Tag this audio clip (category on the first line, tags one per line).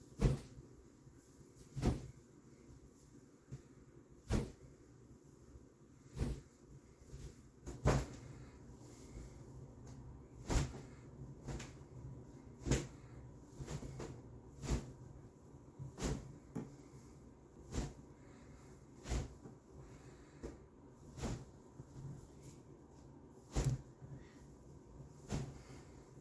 Sound effects > Objects / House appliances
Phone-recording
cloth
foley
swoosh